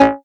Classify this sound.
Instrument samples > Synths / Electronic